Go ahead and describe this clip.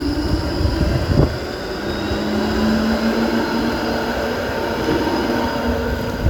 Sound effects > Vehicles
tram-samsung-12
Recording of a tram (Skoda ForCity Smart Artic X34) near a roundabout in Hervanta, Tampere, Finland. Recorded with a Samsung Galaxy S21.
outside, tramway, vehicle, tram